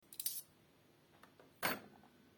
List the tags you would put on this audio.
Sound effects > Objects / House appliances
cook cutlery fork kitchen knife metal rummaging spoon

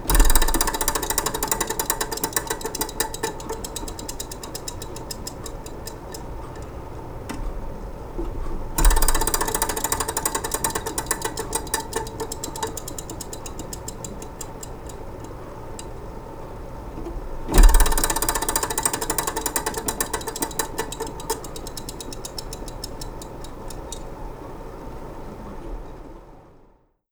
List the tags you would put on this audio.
Sound effects > Other mechanisms, engines, machines
barrel Blue-brand slot-machine Blue-Snowball foley antique

